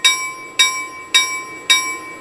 Sound effects > Vehicles
EMD Steel Bell loop (AI generated)
A year ago, I ran a photo of a commuter train through the Melobytes AI Image to Sound generator online, and it included random railway sound effects. This audio was edited from the sounds produced by the Melobytes AI to create a loopable bell sample that sounds like the EMD Steel Bell heard on North American locomotives.